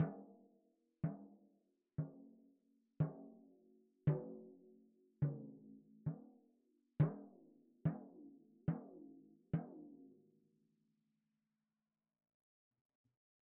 Music > Solo percussion
med low tom-tension hit sequence 4 12 inch Sonor Force 3007 Maple Rack
acoustic, drum, drumkit, flam, kit, Medium-Tom, oneshot, perc, percussion, quality, realdrum, recording, roll, Tom